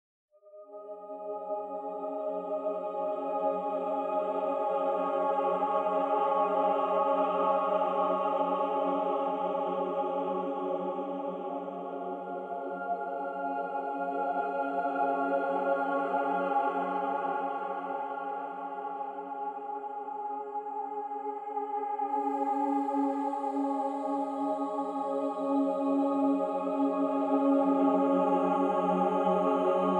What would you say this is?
Music > Solo instrument
Touchstone (vocals only)
choir, emotional, epic, solo